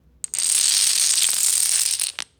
Sound effects > Objects / House appliances
GAMEBoard chips drop hit constant fast chain ceramic curved
The domino pieces fall in a fast chain, tilting and striking a ceramic floor. Their falling speed decreases along the curve.